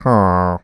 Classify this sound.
Speech > Solo speech